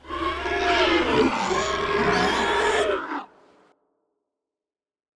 Sound effects > Experimental
PIG ZOMBIES
In my Audio Show Crossing Corpses, many of our zombies sound like frogs and pigs. This is one of many Pig Zombie samples. I support independent creators!
Growl, Sci-Fi, Roar, Zombie, action, Scary, Creature, dytopian, Monster, evil, Horror, adventure, Original, Fantasy, Attack